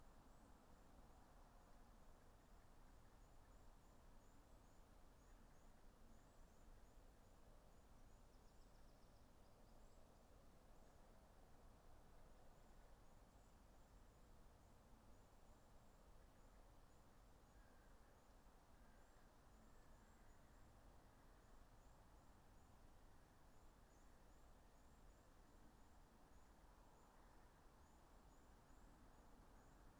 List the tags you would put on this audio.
Soundscapes > Nature
alice-holt-forest
nature
weather-data
modified-soundscape
natural-soundscape
phenological-recording
artistic-intervention
Dendrophone
field-recording
raspberry-pi
soundscape
sound-installation
data-to-sound

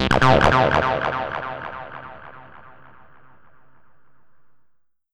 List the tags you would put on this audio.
Instrument samples > Synths / Electronic
140bmp
acid
audacity
bassline
techno
ValhallaDelay

